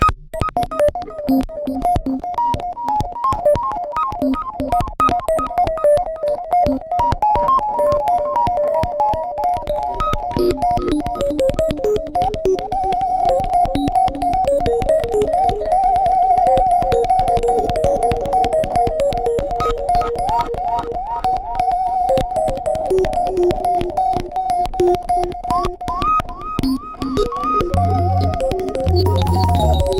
Sound effects > Human sounds and actions
Mouth Blowing Blip Blops Experimental Texture #004
Sounds out of a mouth whistling and blowing air through the lips. You can cut this in several ways or also use it as a basis for some granular synthesis. AI: Suno Prompt: atonal, low tones, experimental, bells, mouth, blip blops, echo, delays